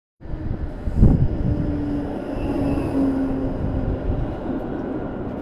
Sound effects > Vehicles
A Tram passes by
Passing, Tram, Tram-stop